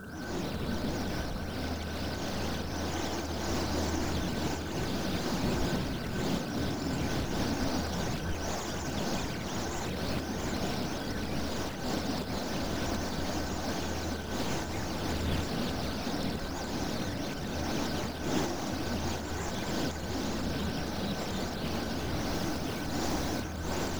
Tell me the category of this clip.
Soundscapes > Synthetic / Artificial